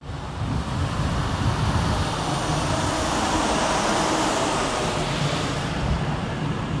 Soundscapes > Urban
Bus driving by recorded on an iPhone in an urban area.Bus driving by recorded on an iPhone in an urban area.
transport, bus